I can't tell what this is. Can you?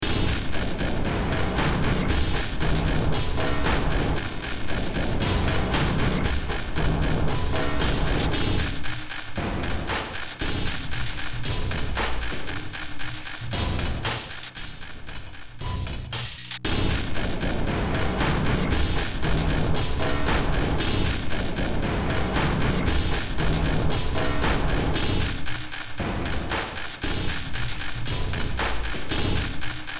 Music > Multiple instruments
Demo Track #3834 (Industraumatic)
Ambient, Games, Soundtrack, Underground, Cyberpunk, Noise, Sci-fi, Industrial, Horror